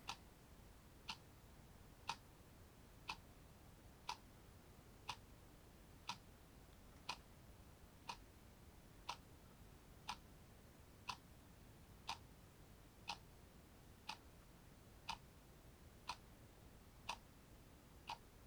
Sound effects > Human sounds and actions
Steady ticking of a small clock, mechanical and rhythmic.
clock, foley, mechanical, tick, ticking